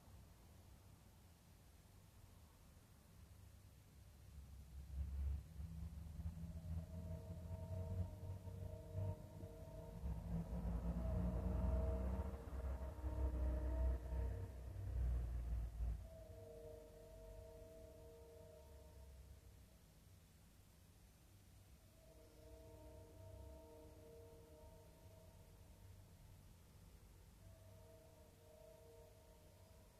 Soundscapes > Indoors
ANVSN0006 AMBIANCE. TRAIN IN THE DISTANCE.
Noche. Interior: departamento en la ciudad. Tren en la distancia. Bocina de tren en la distancia. Algún auto pasa. Tranquilo. Grabado con un Moto g32 Producido en Tucumán, Argentina, en 2025 Night. Interior: apartment in the city. Train in the distance. Train horn in the distance. A car passes by. Quiet. Recorded on a Moto g32